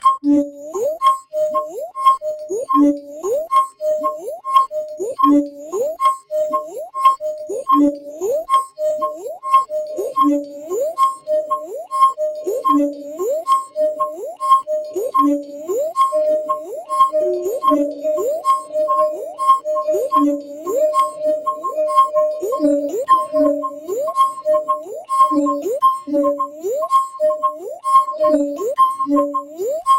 Sound effects > Human sounds and actions
Sounds out of a mouth whistling and blowing air through the lips. You can cut this in several ways or also use it as a basis for some granular synthesis. AI: Suno Prompt: atonal, low tones, experimental, bells, mouth, blip blops, echo, delays